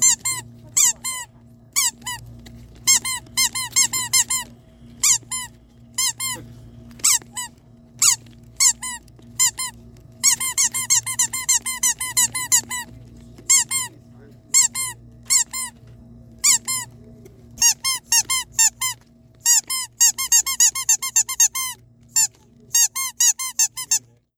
Sound effects > Objects / House appliances
TOYMisc-Samsung Galaxy Smartphone, MCU Dog Toy, Squeaking 02 Nicholas Judy TDC
A dog toy squeaking. Recorded at Dollar Tree.
cartoon, dog, Phone-recording, squeak, toy